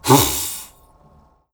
Sound effects > Human sounds and actions
MAGPoof-Blue Snowball Microphone, CU Foof, Vocal, Cartoon Nicholas Judy TDC
A vocal 'foof'. Also useful for cartoon camera flash.
Blue-brand, cartoon, vocal, Blue-Snowball, foof, poof